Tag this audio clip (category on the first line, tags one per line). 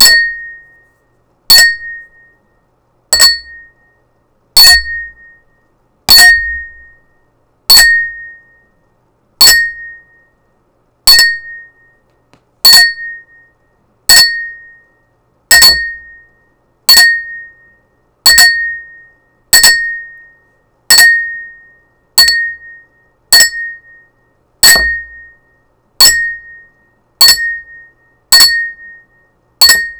Sound effects > Objects / House appliances
Blue-brand
Blue-Snowball
ding
double
toast